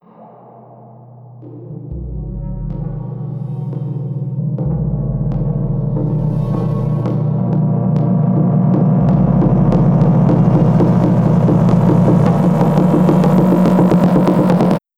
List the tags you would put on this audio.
Soundscapes > Synthetic / Artificial
tension
suspense
riser
approaching
scary
closing
dramatic
buildup

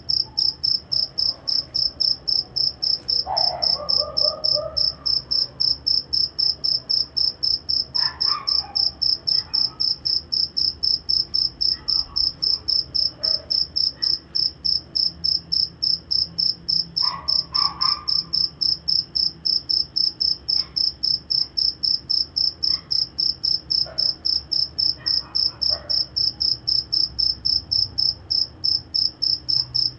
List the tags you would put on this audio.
Soundscapes > Nature
AMB
Banepa
Cricket
Dog
Nepal
Night

